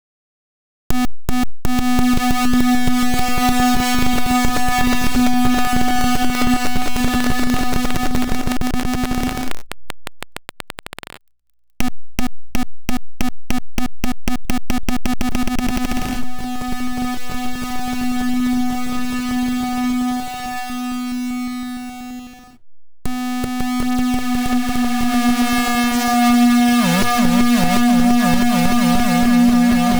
Sound effects > Electronic / Design
Optical Theremin 6 Osc ball delay
Dub, Alien, Theremin, Electro, Spacey, Scifi, Sweep, Optical, SFX, Otherworldly, Sci-fi, Glitchy, Robotic, Handmadeelectronic, Theremins, Bass, Noise, Synth, Infiltrator, Instrument, Experimental, noisey, Robot, Trippy, Digital, DIY, FX, Glitch, Analog, Electronic